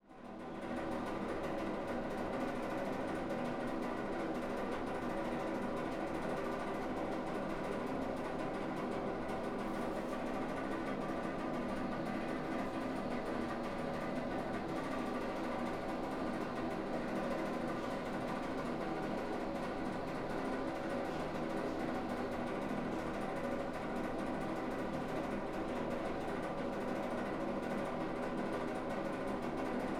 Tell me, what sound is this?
Sound effects > Objects / House appliances
Faulty Bathroom Fan
Recording of a faulty, rattling bathroom fan.
fan, bathroom, faulty, motor, rattle